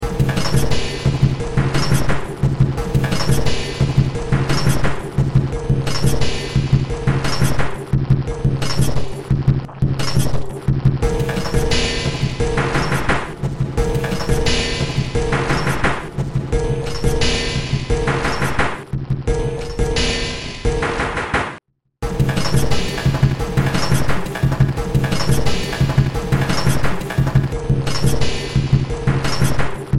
Music > Multiple instruments
Short Track #3873 (Industraumatic)
Ambient, Soundtrack, Noise, Sci-fi